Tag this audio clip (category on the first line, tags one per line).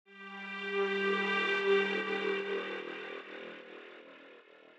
Sound effects > Electronic / Design
abstract
effect
electric
fx
psyhedelic
sci-fi
sound-design
sounddesign
soundeffect